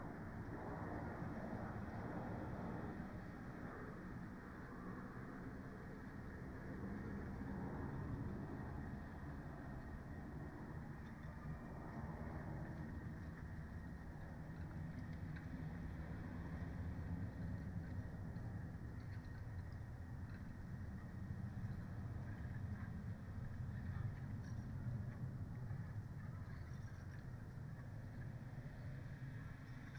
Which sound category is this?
Soundscapes > Nature